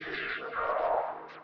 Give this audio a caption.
Soundscapes > Synthetic / Artificial
LFO Birdsong 4
Birdsong, LFO, massive